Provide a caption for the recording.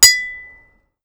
Sound effects > Objects / House appliances
FOODGware-Blue Snowball Microphone, CU Ceramic Mug Ding 05 Nicholas Judy TDC
A ceramic mug ding.
foley, ding, ceramic, Blue-Snowball, mug, Blue-brand